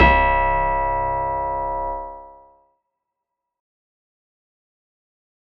Instrument samples > Synths / Electronic
Deep Pads and Ambient Tones25
Ambient, Synthesizer, Tone, Note, bass, Digital, Oneshot, Pads, Analog, Deep, Chill, Synth, Ominous, Pad, synthetic, Tones, Dark, Haunting, bassy